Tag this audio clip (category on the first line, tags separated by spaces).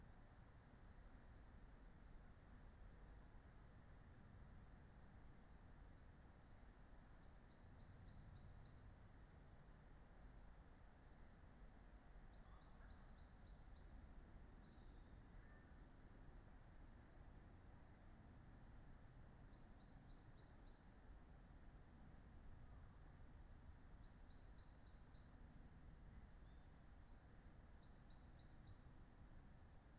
Soundscapes > Nature
Dendrophone data-to-sound alice-holt-forest field-recording artistic-intervention natural-soundscape soundscape weather-data modified-soundscape sound-installation raspberry-pi phenological-recording nature